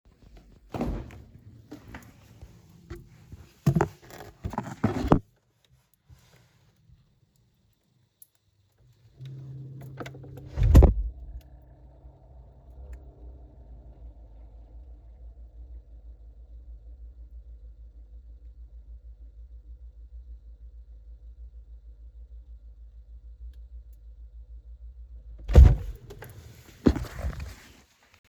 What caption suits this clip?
Sound effects > Objects / House appliances
Putting iPhone in Freezer
Hear my place my iPhone in a Samsung Freezer, and take it out again. I wanted to record the ice crackling, but then there was a pretty nice hum :).
binaural, boxed-in, buzz, buzzing, cooler, crackling, field-recording, freezer, fridge, heat-pump, hum, humming, ijskast, industrial, iPhone, iphone-13-mini, koelkast, machine, muffled, noise, noises, refrigerator